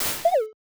Sound effects > Electronic / Design
DIGITAL HARSH SERVER CHIP
BEEP, BOOP, CHIPPY, CIRCUIT, COMPUTER, DING, ELECTRONIC, EXPERIMENTAL, HARSH, HIT, INNOVATIVE, OBSCURE, SHARP, SYNTHETIC, UNIQUE